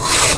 Sound effects > Objects / House appliances
A card rip.